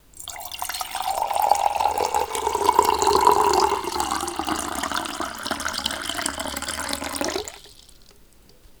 Sound effects > Objects / House appliances
Pouring water into a glass
Sound of water being poured into a glass. Recorded by me in a quiet environment with clear, close-up audio.
kitchen, fill, glass, water, liquid, pouring